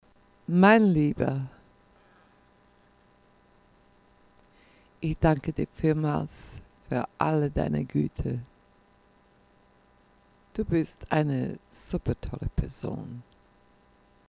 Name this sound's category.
Speech > Conversation / Crowd